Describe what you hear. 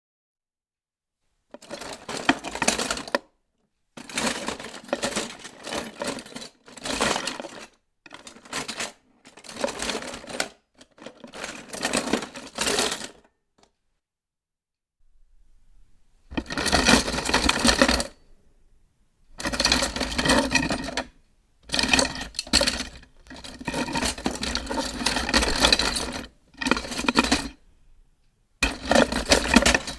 Sound effects > Objects / House appliances
Rummaging and handling kitchen utensils in a kitchen draw.